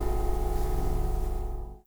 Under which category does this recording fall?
Music > Solo instrument